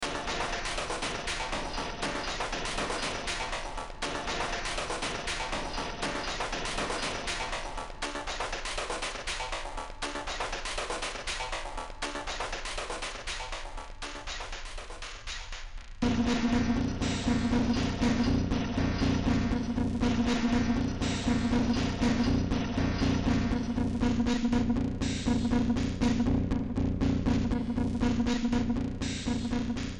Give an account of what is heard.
Music > Multiple instruments
Demo Track #3461 (Industraumatic)
Ambient, Cyberpunk, Horror, Industrial, Underground